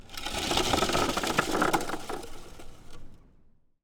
Sound effects > Objects / House appliances
Sound of toy car rolling on gravel. Recorded on AT879 and mixed in Adobe Audition.
childish, toy, toycar